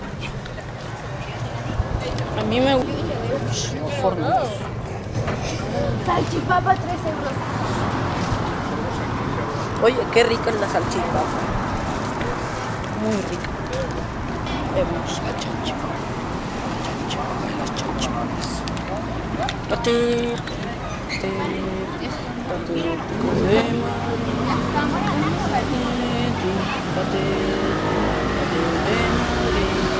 Soundscapes > Urban
20251114 MuralCarmel Voices Humans Cars Commercial Noisy Complex
Cars, Commercial, Humans, Voices, Noisy, Complex